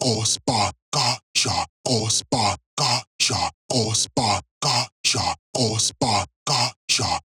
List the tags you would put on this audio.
Music > Other
Distorted
Acapella
Vocal
BrazilFunk

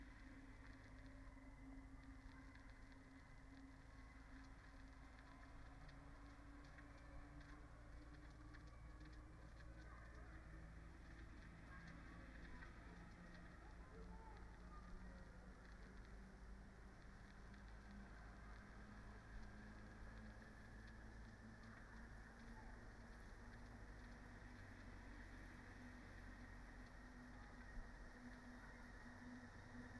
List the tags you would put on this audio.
Soundscapes > Nature
alice-holt-forest
artistic-intervention
Dendrophone
field-recording
modified-soundscape
phenological-recording
raspberry-pi
sound-installation
weather-data

